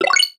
Sound effects > Electronic / Design
Upward Chirp
A delightful lil chime/ringtone, made on a Korg Microkorg S, edited and processed in Pro Tools.
beep, bleep, blip, chirp, click, computer, electronic, game, microkorg, sfx